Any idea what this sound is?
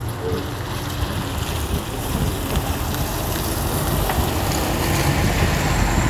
Soundscapes > Urban
Bus leaving bus stop
Bus leaving the bus stop: Bus starting, Engine rolling faster, Traffic background sounds. Recorded with Samsung galaxy A33 phone recorder. The sound is not processed: recorder on afternoon winter in Tampere, Finland.